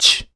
Sound effects > Human sounds and actions
Hurt - Thss
Mid-20s, Tascam, talk, pain, oneshot, Male, U67, Man, Hurt, Neumann, voice, Video-game, Human, Voice-acting, Vocal, Single-take, FR-AV2, NPC, dialogue, singletake